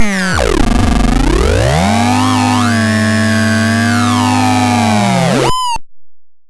Sound effects > Electronic / Design
Optical Theremin 6 Osc dry-070
FX, SFX, Sweep, Infiltrator, Alien, Synth, Spacey, Electronic, Dub, Glitch, Digital, Robotic, Instrument, Bass, Noise, Otherworldly, Electro, Scifi, noisey, Optical, Theremin, Theremins, Handmadeelectronic, Robot, Sci-fi, Experimental, DIY, Glitchy, Trippy, Analog